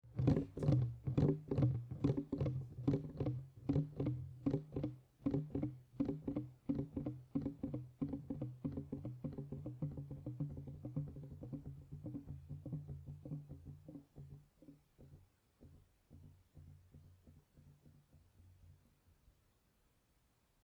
Sound effects > Objects / House appliances
Plastic bottle wobble
Stereo recording of plastic bottle rolling back and forth naturally
Push, wobble, Plastic, bootle